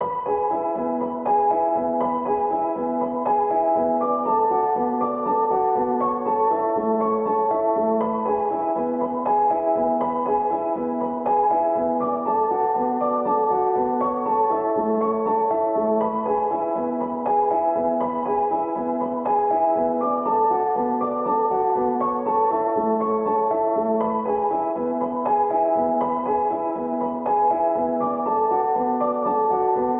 Music > Solo instrument
Piano loops 199 efect 4 octave long loop 120 bpm
free, 120, samples, pianomusic, 120bpm, simplesamples, reverb, simple, piano, loop, music